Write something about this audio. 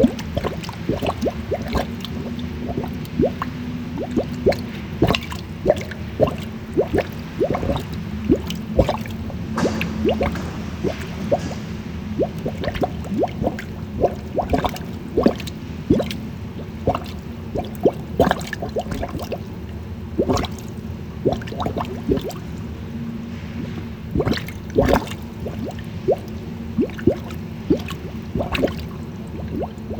Sound effects > Objects / House appliances
water and sewage bubbling
bubbling, sewage, water